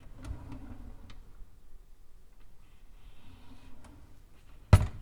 Sound effects > Objects / House appliances
Rolling Drawer 01
drawer, dresser, open